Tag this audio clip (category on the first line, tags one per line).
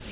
Sound effects > Objects / House appliances
tool
slam
knock
object
plastic
container
kitchen
garden
household
debris
bucket
clatter
pour
spill
foley
shake
metal
tip
pail
fill
cleaning
liquid
lid
drop
carry
handle
water
clang
hollow
scoop